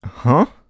Speech > Solo speech
Surprised - Huh 2
dialogue, FR-AV2, Human, Male, Man, Mid-20s, Neumann, NPC, oneshot, singletake, Single-take, sound, surprised, talk, Tascam, U67, Video-game, Vocal, voice, Voice-acting